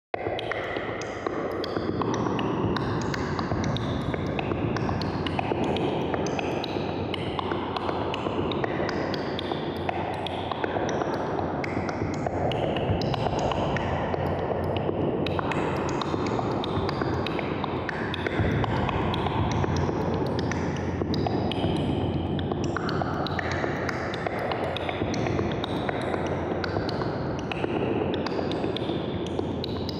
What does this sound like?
Music > Solo percussion
Perc Loop-Huge Reverb Percussions Loop 5
Cave, Loop, Cinematic, reverb, Ambient, Underground, Percussion